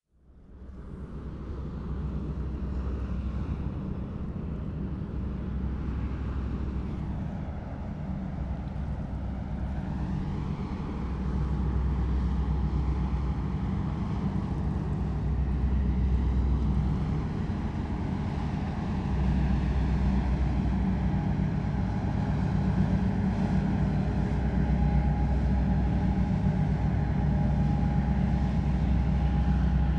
Soundscapes > Urban
Dunkerque remorqueur DigueDuBraek
A tugboat and its powerful engine is passing along the famous "Digue du Braek" in Dunkerque's industrial port, and the following wake making waves. Industries in the background. Un bateau remorqueur au traverse la célèbre Digue du Braek dans le port industriel de Dunkerque, suivi des vagues provoquées par son sillage. Ambiance industrielle environnante. Sennheiser MKH30&MKH50, decoded MS stereo